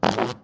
Sound effects > Other
Genuine fart recorded with smartphone.
fart, flatulence, gas